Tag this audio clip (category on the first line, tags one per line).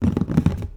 Sound effects > Objects / House appliances
water
metal
kitchen
tip
clatter
foley
knock
fill
tool
bucket
spill
carry
plastic
drop
garden
scoop
container
hollow
household
lid
liquid
slam
clang
pour
object
pail
debris
shake
cleaning
handle